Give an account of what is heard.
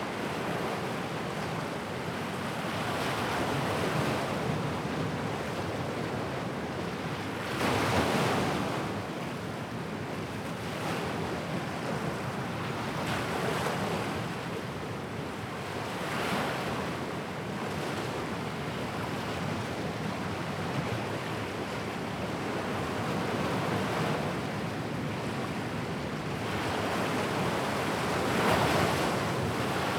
Soundscapes > Nature
Ambiance Ocean Ponta do Arnel Loop Stereo 01
Ocean - Close/Medium Recording - Loop Recorded at Ponta do Arnel, Sao Miguel. Gear: Sony PCM D100.
ambience, azores, coast, coastal, environmental, fieldrecording, foam, lighthouse, loop, marine, natural, nature, ocean, pontadoarnel, portugal, relaxation, saomiguel, sea, seaside, shoreline, soundscape, stereo, surf, water, waves, wind